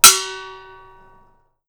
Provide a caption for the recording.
Sound effects > Objects / House appliances
METLImpt-Blue Snowball Microphone Metal, Clang, Small, Thin, Brassy, Asian Gong Ring Nicholas Judy TDC
A metal clang.
asian, Blue-brand, Blue-Snowball, brassy, clang, gong, metal, ring, small, thin